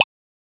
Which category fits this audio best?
Sound effects > Objects / House appliances